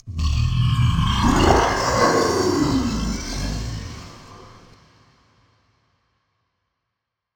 Sound effects > Experimental
Creature Monster Alien Vocal FX (part 2)-023
howl, devil, bite, zombie, growl, grotesque, otherworldly, snarl, mouth, dripping, weird, Monster, Creature, Sfx, gross, demon, Alien, fx